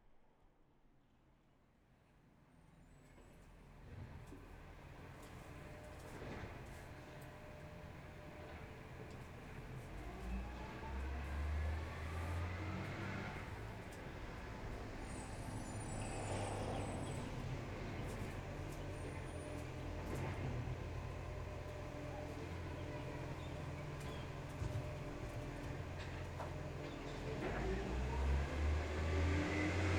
Sound effects > Other mechanisms, engines, machines
Garbage Truck driving along a suburban road, picking up trash from approach to departure. Recorded with a Zoom H6
truck, trash, unload, sfx